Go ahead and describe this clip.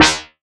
Instrument samples > Synths / Electronic
SLAPMETAL 2 Eb
bass, fm-synthesis